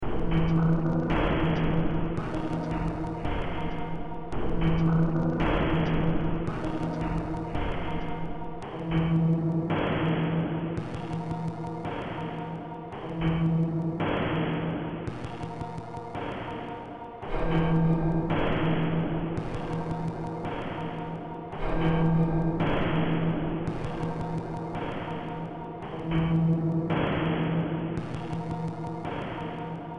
Music > Multiple instruments
Demo Track #3126 (Industraumatic)
Ambient, Cyberpunk, Games, Horror, Industrial, Noise, Sci-fi, Soundtrack, Underground